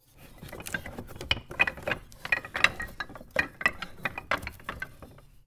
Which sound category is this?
Music > Solo instrument